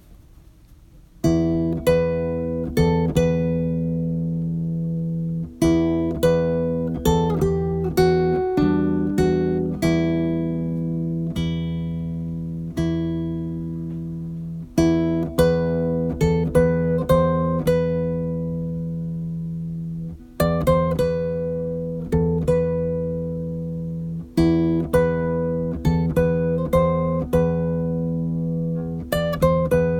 Music > Solo instrument
Classical Guitar Riff
Background music for a DND campaign recorded on my phone. Pairs well with atmospherics on Roll20.
acoustic, instrument, strings